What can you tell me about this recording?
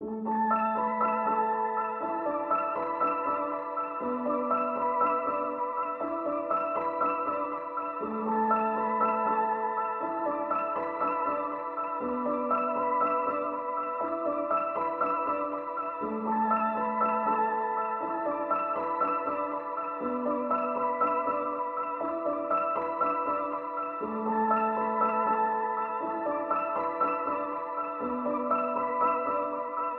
Music > Solo instrument
Piano loops 189 efect 2 octave long loop 120 bpm
120bpm, simplesamples, music, simple, 120, free, loop, piano, reverb, pianomusic, samples